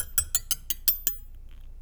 Sound effects > Objects / House appliances
knife and metal beam vibrations clicks dings and sfx-117
Beam, ding, Foley, FX, Klang, Metal, Perc, SFX, ting, Vibrate, Vibration, Wobble